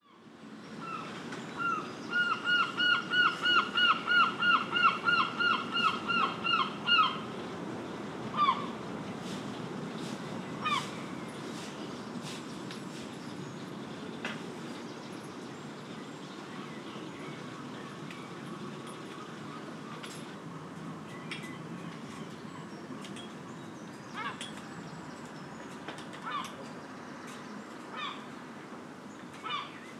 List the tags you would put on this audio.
Soundscapes > Urban
people cityscape aeroplane plane street cars urban birds traffic starlings motorbike city town seagulls field-recording noise blackbird

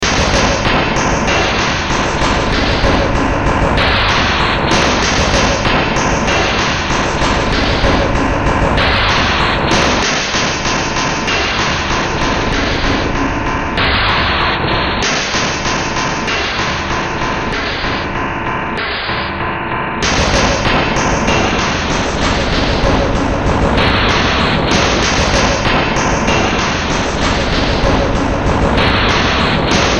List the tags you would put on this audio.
Music > Multiple instruments
Noise Soundtrack Ambient Underground Sci-fi Horror Cyberpunk Games Industrial

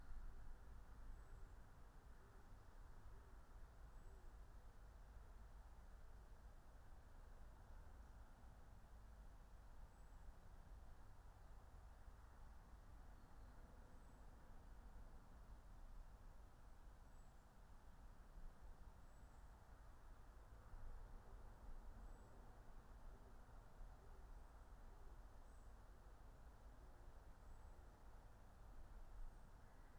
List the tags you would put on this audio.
Soundscapes > Nature
alice-holt-forest raspberry-pi nature natural-soundscape phenological-recording field-recording soundscape meadow

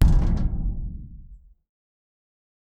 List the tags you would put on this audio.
Sound effects > Other mechanisms, engines, machines
hit metal percussion boom big hollow drum dumbster industrial metallic

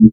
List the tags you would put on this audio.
Instrument samples > Synths / Electronic
additive-synthesis fm-synthesis

